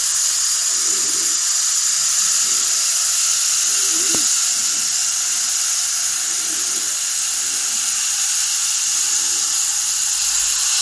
Sound effects > Natural elements and explosions
WATRFizz-Samsung Galaxy Smartphone, CU Antacid, Looped Nicholas Judy TDC
Antacid fizzing. Looped.